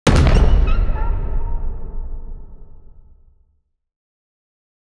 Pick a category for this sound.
Sound effects > Other